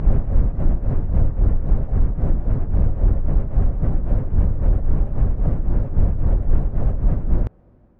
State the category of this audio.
Sound effects > Electronic / Design